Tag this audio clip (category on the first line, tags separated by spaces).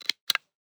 Sound effects > Human sounds and actions
off interface toggle button click activation switch